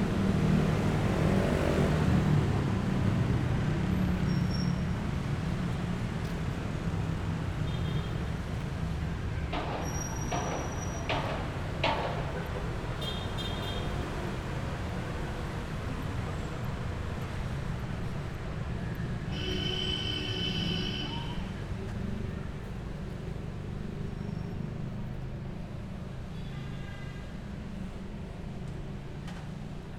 Soundscapes > Urban
Paco Park, Manila Philippines
A green refuge in the city where footsteps echo softly within circular walls. Paco Park, once a resting place turned into a serene park for reflection and rest. The blend of history and calm makes it a quiet escape from Manila’s rush. Specific sounds you can hear: student chatter, slight vehicle sounds, wind, birds, usual quiet park, and more.
Manila, Quiet-Ambience, Park, People, Field-Recording, Urban, Paco-Park, Vehicles